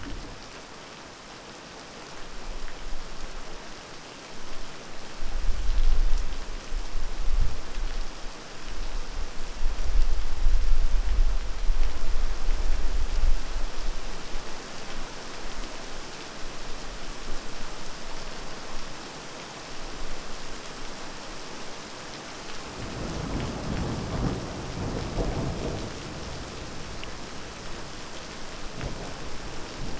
Soundscapes > Nature
Summer rainstorm with thunder
Heavy rain on tarmac with thunder, a couple of cars pass by on wet road.
thunderstorm, nature, rainstorm, rain, thunder, weather, lightning, storm, field-recording